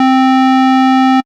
Instrument samples > Synths / Electronic
04. FM-X ODD1 SKIRT5 C3root
FM-X, MODX, Montage, Yamaha